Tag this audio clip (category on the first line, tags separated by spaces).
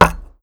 Sound effects > Objects / House appliances
Blue-brand Blue-Snowball down foley set spray-can